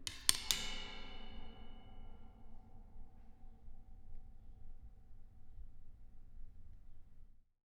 Sound effects > Objects / House appliances
Hitting metal staircase 3
Hitting the metal part of the staircase in my apartment building.
Echo, Metal, Metallic, Staircase, Stairs